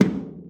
Instrument samples > Percussion
drum-004 floor tom2
My sister's floor tom2 with damper rubber ring. And I've removed the reverb. This audio still has a slight sense of space, possibly due to the recording distance.
one-shot, percussion, tom